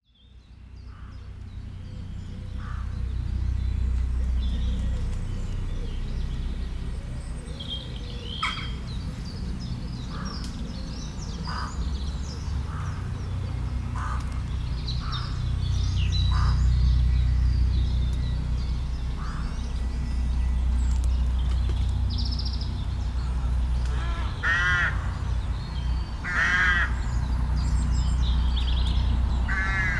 Soundscapes > Urban
A recording in a residential area.
ambience, birds, Field, recording, residential, traffic